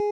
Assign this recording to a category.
Instrument samples > String